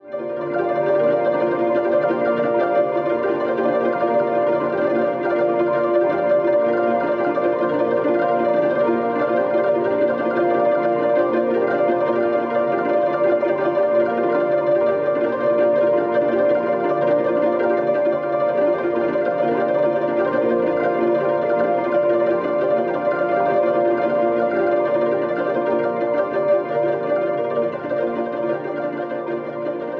Soundscapes > Synthetic / Artificial
Botanica-Granular Ambient 5
Botanical, Botanica, Ambient